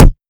Instrument samples > Synths / Electronic
"f*ck" Kick
Just a dariacore kick.
base, base-drum, basedrum, bass, bass-drum, bassdrum, drum, drum-kit, drums, kick, kick-drum, kickdrum, kit, one-shot, oneshot, perc, percussion